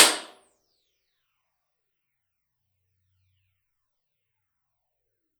Sound effects > Other
Bathroom impulse response 2
Impulse response of the main bathroom in my house. Medium, tile walls and floor. (Speaker was placed in the shower box.)
reverberation, reverb, ir, convolution, bathroom